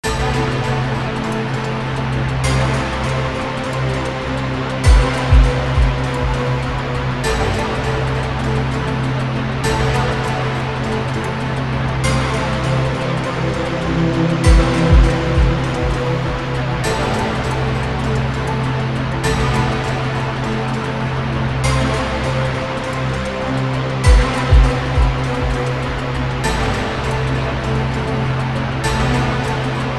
Music > Multiple instruments
Ableton Live. VST....Fury-800......Atmosfere Free Music Slap House Dance EDM Loop Electro Clap Drums Kick Drum Snare Bass Dance Club Psytrance Drumroll Trance Sample .